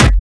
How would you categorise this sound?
Instrument samples > Percussion